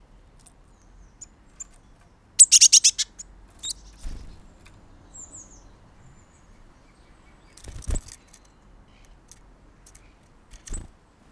Soundscapes > Nature
Eurasian Blue Tit Call and Wing Sounds
Eurasian blue tit (Cyanistes caeruleus) calls and flies around the microphone. The call can be heard first and then the wings of the bird. Recorded with an unattended setup: Zoom H1essential and clippy mic.